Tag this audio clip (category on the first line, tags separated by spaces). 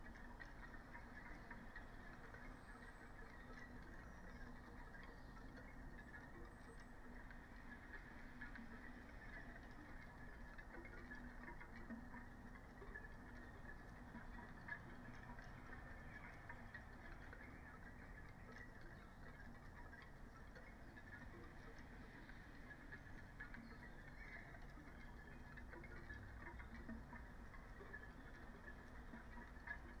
Soundscapes > Nature
field-recording modified-soundscape sound-installation weather-data